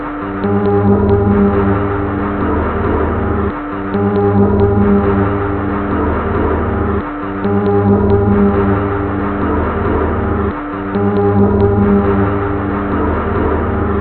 Instrument samples > Percussion
This 137bpm Drum Loop is good for composing Industrial/Electronic/Ambient songs or using as soundtrack to a sci-fi/suspense/horror indie game or short film.
Alien, Ambient, Dark, Drum, Industrial, Loop, Loopable, Packs, Samples, Soundtrack, Underground, Weird